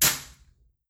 Sound effects > Objects / House appliances
TOONSplt-Samsung Galaxy Smartphone, CU Egg, Drop, Splat Nicholas Judy TDC
An egg drop. Splat.